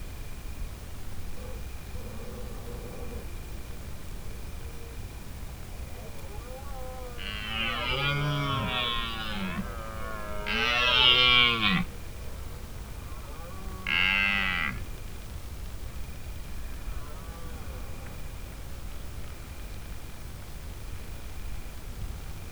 Sound effects > Animals
250910 22h44 Gergueil D104 - Brame
Subject : Stag bellowing in Gergueil. Sennheiser MKE600 with stock windcover. P48, no filter. A manfroto monopod was used. Weather : Processing : Trimmed and normalised in Audacity. Notes : Electric poles were close. So there's a slight buzz :/ Other spots we tried didn't have much activity or too much wind.